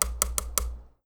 Sound effects > Objects / House appliances
FOLYProp-Blue Snowball Microphone, CU Baton, Tapping 01 Nicholas Judy TDC
A baton tapping.
tap, baton, Blue-Snowball, conductor